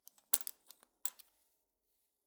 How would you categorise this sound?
Sound effects > Objects / House appliances